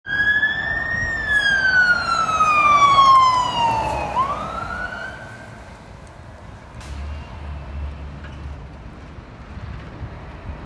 Sound effects > Vehicles
Cardiff - Fire Engine Drive By
Just a quick iPhone recording of a passing fire engine in Cardiff city centre.
cardiff, sirens, uk, engine, fire, fireengine